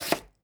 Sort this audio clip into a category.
Sound effects > Other